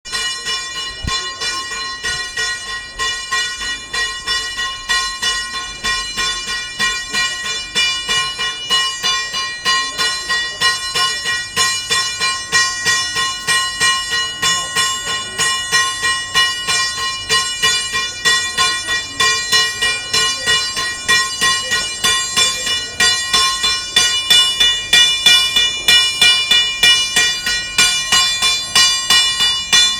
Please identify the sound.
Sound effects > Objects / House appliances
Artisan hammers Bronze, Marrakesh, Morocco. Recorded with a phone.